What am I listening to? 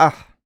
Speech > Solo speech
Hurt - Ah
FR-AV2, talk, oneshot, Male, dialogue, Mid-20s, U67, Human, Neumann, pain, Voice-acting, Single-take, Vocal, Tascam, Man, Hurt, Video-game, voice, singletake, NPC